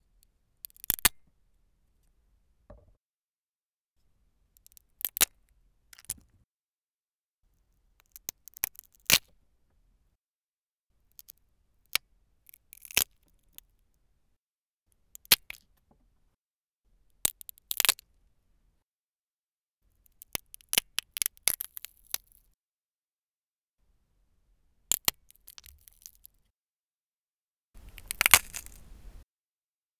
Sound effects > Objects / House appliances
Crunching and cracking sounds made by cracking walnuts with a walnut cracker. Recorded this sound effect using a Zoom Audio Recorder H6. Credit isn’t necessary, though obviously appreciated if possible.